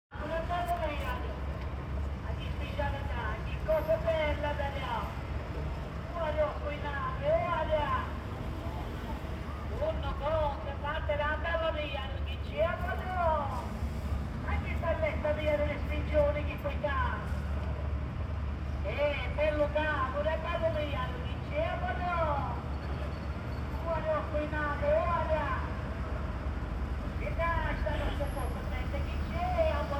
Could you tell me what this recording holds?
Sound effects > Human sounds and actions
Street Vendor of Pizza in Palermo (Sfincionaro)
Sfincionaro - Street Vendor of Sfincione Pizza in Palermo with his iconic Apecar and megaphone
Street, Pizza, italy, traditional, Field-recording, Sfincione, Palermo, sfincionaro, megaphone, Vendor